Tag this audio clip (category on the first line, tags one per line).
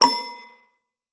Sound effects > Electronic / Design
game
interface